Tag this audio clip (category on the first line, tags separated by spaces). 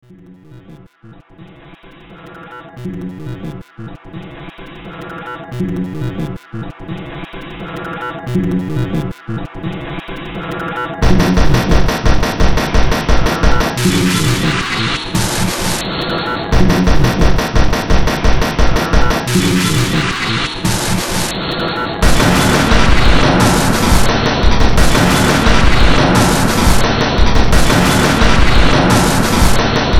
Music > Multiple instruments
Underground Horror Noise Sci-fi Games Industrial Soundtrack Ambient Cyberpunk